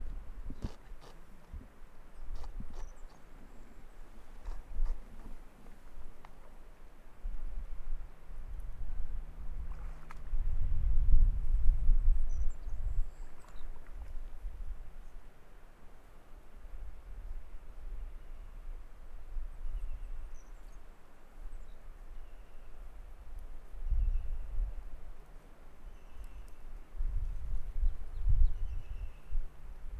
Soundscapes > Nature
Bear slurping from mud puddle
Yup, a Coastal Brown Bear slurping up a drink from a mud puddle at Silver Salmon Creek, Alaska
Bear, Animal, Eating